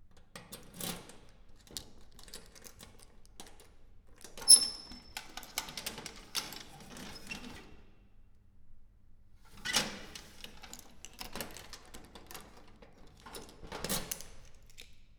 Sound effects > Objects / House appliances
Mailbox opening and closing
This is our (metal) mailbox in the stairwell being unlocked, opened, closed and locked.
closing, door, mailbox, metal, opening